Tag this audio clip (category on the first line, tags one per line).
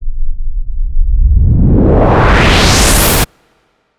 Sound effects > Electronic / Design
effects
sfx
fx
effect
sound-effect
sound-design
soundboard
post
film
films
movie
sounddesign
lfe
post-production
sound
design
movies